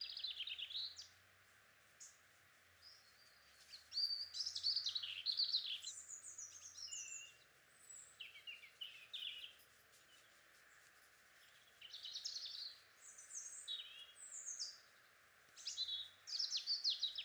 Soundscapes > Nature
Bird in a tree with slight wind in leaves, recorded on a Rode NTG-3 and edited to be used as an infinite loop.